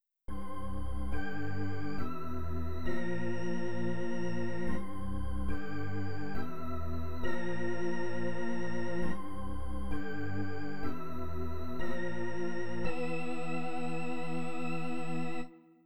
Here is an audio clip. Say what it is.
Music > Solo instrument
A team of investigators search for a monster. In the distance, the monster appears to the sounds of a wobbly, echo-y organ motif. A tribute to B-movie monsters and watching old black and white movies on Saturday afternoons. Made with my MIDI controller.